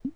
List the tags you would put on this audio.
Soundscapes > Nature
Pop Bubble Bubbles Water

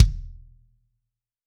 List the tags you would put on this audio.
Instrument samples > Percussion
drums kickdrum trigger sample

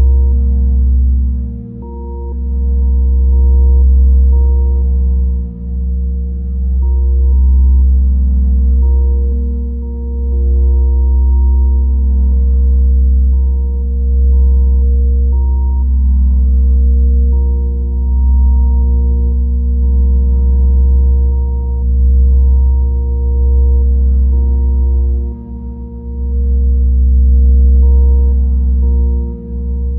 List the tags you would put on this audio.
Soundscapes > Synthetic / Artificial
atmosphere drone soundscape

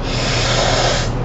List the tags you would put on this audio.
Sound effects > Human sounds and actions
Cold Effect Freze Mouth

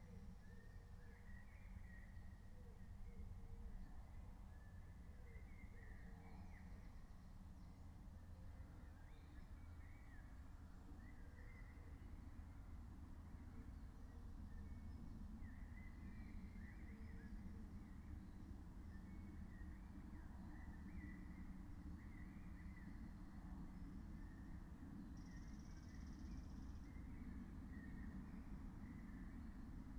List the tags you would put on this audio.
Soundscapes > Nature
natural-soundscape field-recording nature soundscape raspberry-pi alice-holt-forest phenological-recording meadow

